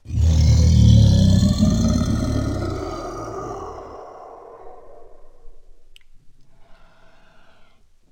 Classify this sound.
Sound effects > Experimental